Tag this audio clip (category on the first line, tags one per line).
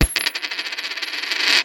Sound effects > Objects / House appliances
nickel
foley
drop
Phone-recording
spin